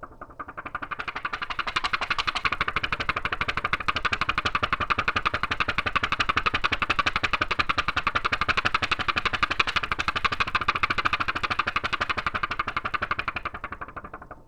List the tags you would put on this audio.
Sound effects > Objects / House appliances
cartoon
warble
paper
Blue-Snowball
Blue-brand

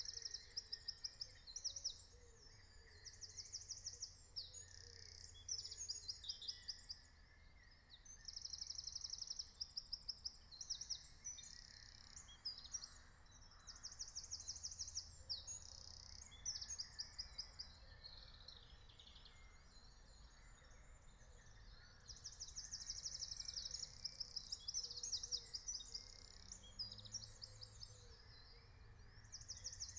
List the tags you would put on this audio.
Soundscapes > Nature
phenological-recording
soundscape
nature
alice-holt-forest
natural-soundscape
raspberry-pi
field-recording
meadow

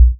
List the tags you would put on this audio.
Instrument samples > Percussion
hi-bass
backing-bass
helper
low
sinewave
sine
bass
bass-helper

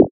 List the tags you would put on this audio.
Instrument samples > Synths / Electronic
bass
additive-synthesis

